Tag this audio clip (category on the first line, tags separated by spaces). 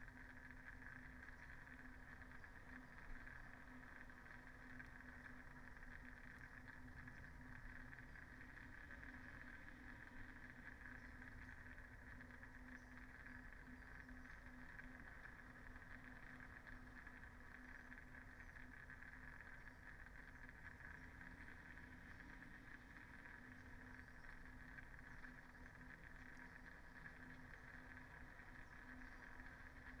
Soundscapes > Nature
Dendrophone field-recording phenological-recording alice-holt-forest natural-soundscape nature sound-installation data-to-sound modified-soundscape artistic-intervention